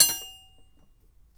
Sound effects > Other mechanisms, engines, machines
metal shop foley -182
boom, little, wood, metal, percussion, bang, bop, sfx, fx, oneshot, tools, foley, sound, rustle, thud, perc, knock, shop, bam, strike, tink, crackle, pop